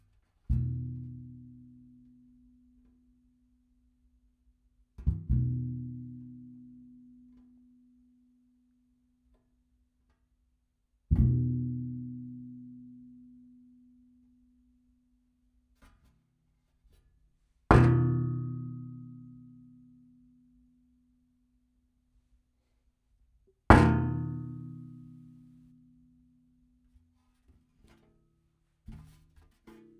Sound effects > Objects / House appliances
Oven tray - Dji mic 3
Subject : A oven tray with a mic mounted on it. Hitting it and scratching it and various sounds Date YMD : 2025 December 15 Location : Albi 81000 Tarn Occitanie France. Hardware : DJI mic 3 TX onboard recording Weather : Processing : Trimmed and normalised in Audacity.
cling, dji, dji-mic3, hit, metal, metalic, mic3, oneshot, oven-tray, percussion, scratching, tinny, tray